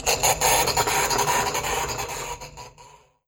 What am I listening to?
Sound effects > Objects / House appliances
A chatter telephone driving away.